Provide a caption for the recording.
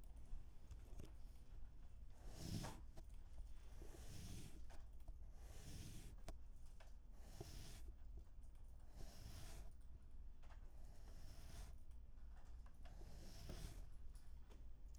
Soundscapes > Indoors
Wiping something down with a tissue/napkin to clean.
sound, sounddesign, soundeffect